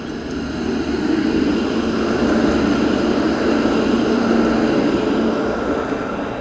Sound effects > Vehicles
A tram passing by in Tampere, Finland. Recorded with OnePlus Nord 4.